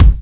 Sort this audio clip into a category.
Instrument samples > Percussion